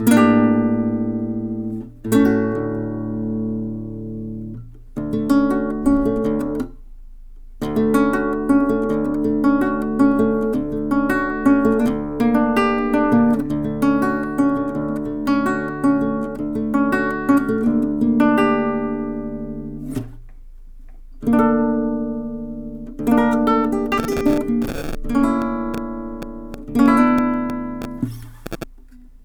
Music > Solo instrument
acoustic guitar pretty notes 1
knock, string, pretty, dissonant, twang, slap, solo, instrument, chords, acosutic, guitar, riff